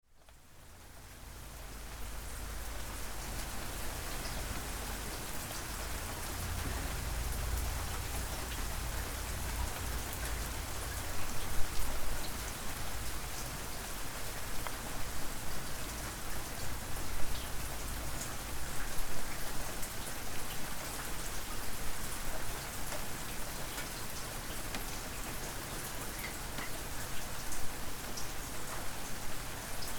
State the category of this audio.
Soundscapes > Nature